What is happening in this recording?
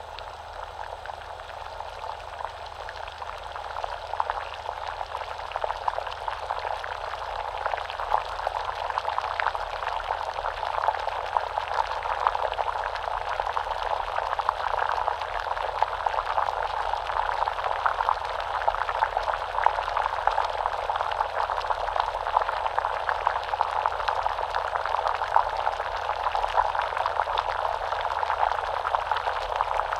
Sound effects > Natural elements and explosions
I have captured boiling water with a ZOOM H1 field recorder.
Bubbles, Water